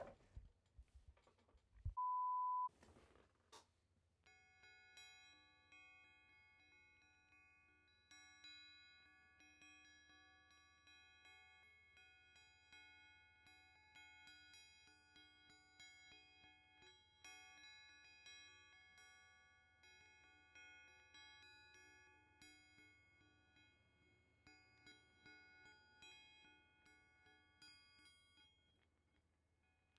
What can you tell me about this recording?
Sound effects > Experimental
Weird chinese-looking metal ball my GF found somewhere; makes somewhat interesting sounds. Recorded using a Zoom H4Essential recorder with its native mic.